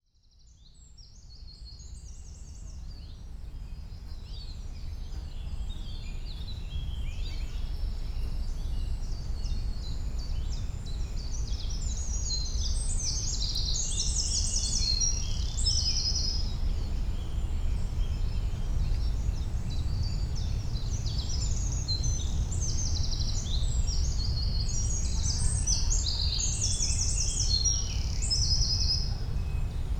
Soundscapes > Nature
A recording from a recent visit to Macclesfield Forest. Morning time.
ambience, birds, field-recording, forest, nature